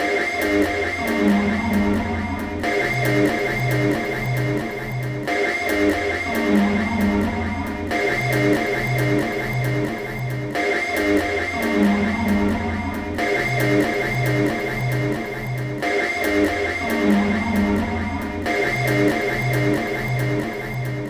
Instrument samples > Percussion
This 91bpm Drum Loop is good for composing Industrial/Electronic/Ambient songs or using as soundtrack to a sci-fi/suspense/horror indie game or short film.
Soundtrack, Loop, Industrial, Ambient, Loopable, Underground, Dark, Packs, Samples, Drum, Alien, Weird